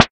Instrument samples > Synths / Electronic
SLAPMETAL 8 Bb
fm-synthesis, bass, additive-synthesis